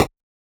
Instrument samples > Percussion
8 bit-Noise Percussion3
FX, game, percussion, 8-bit